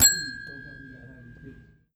Sound effects > Objects / House appliances
BELLHand-Samsung Galaxy Smartphone, CU Desk, Ring 01 Nicholas Judy TDC
A desk bell ringing. Recorded at Mario's Italian Restaurant.
Phone-recording, bell